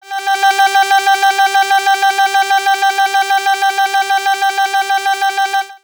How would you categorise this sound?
Sound effects > Electronic / Design